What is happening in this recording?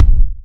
Instrument samples > Percussion
A semimuffled kick with a weak attack, warm (bassranged) but not very audible. A heart-beat fatkick.
bass, bass-drum, bassdrum, beat, death-metal, drum, drums, fat-drum, fatdrum, fat-kick, fatkick, groovy, headsound, headwave, hit, jazz, kick, mainkick, metal, natural, Pearl, percussion, percussive, pop, rhythm, rock, thrash, thrash-metal, trigger, warmkick